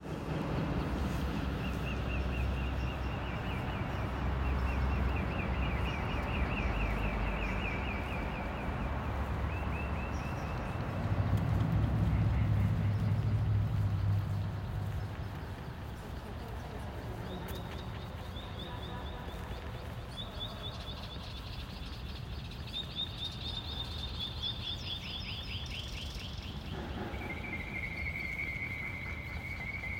Soundscapes > Urban
sounds of memory
Ambient sounds of singing birds, footsteps on pavement, buzzing insects, barking dogs, vehicles passing by, and distant voices, with an added echo and reverb to give a sense of the past, memory, and recall. Originally recorded with an iPhone and enhanced and edited with BandLab.
birds, soundscapes, field-recording, soundeffects, vehicles, noise, soundscape, atmosphere, ambience, ambient, soundeffect, sound, sounds